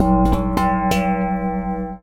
Sound effects > Objects / House appliances
Junkyard Foley and FX Percs (Metal, Clanks, Scrapes, Bangs, Scrap, and Machines) 37
Junkyard, Robotic, trash, Environment, Metallic, Dump, Metal, Atmosphere, Perc, waste, Foley, Percussion, rubbish, rattle, Machine, Robot, Clang, dumpster, scrape, FX, tube, Bang, Ambience, Bash, dumping, SFX, Clank, Smash, garbage, Junk